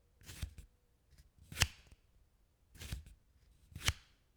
Sound effects > Objects / House appliances
250726 - Vacuum cleaner - Philips PowerPro 7000 series - Opening and closing handle valve
7000, aspirateur, cleaner, FR-AV2, Hypercardioid, MKE-600, MKE600, Powerpro, Powerpro-7000-series, Sennheiser, Shotgun-mic, Shotgun-microphone, Single-mic-mono, Tascam, Vacum, vacuum, vacuum-cleaner